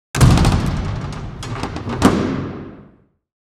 Sound effects > Other
Closing large metal door-002
bunker, clang, closing, door, echoing, fortress, gate, heavy, industrial, large, metal, resonant, scraping, sealing, secure, slam, thud, vault